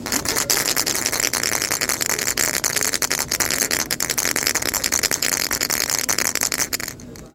Sound effects > Objects / House appliances
TOONShake-Samsung Galaxy Smartphone, CU Toy Nicholas Judy TDC
A toy shake. Recorded at Goodwill.
cartoon, Phone-recording, shake, toy